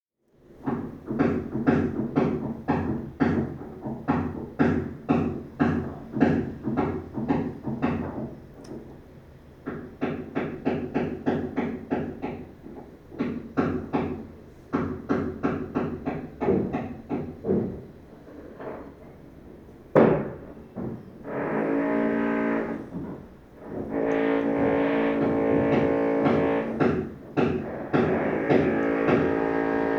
Sound effects > Other mechanisms, engines, machines
Three neighboring apartments decided to remodel simultaneously, and far from being angry, I decided to record a few minutes of the torture and share it with all of you, sonic nerds of the world. Perhaps the annoyance that will accompany me for a month will be useful to someone who needs this for a project. The package includes three zones: the hammering zone, the drilling zone, and an intermediate zone where both fight equally to drive the other neighbors crazy. Enjoy the horror.